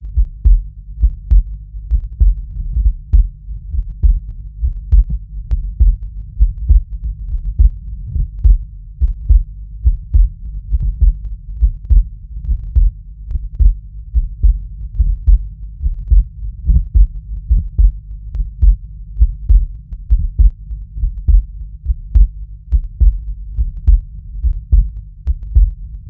Sound effects > Human sounds and actions
Real Heartbeat (DIY Stethoscope recording)
This is my heartbeat.
effect, heart, heartbeat, medical, sound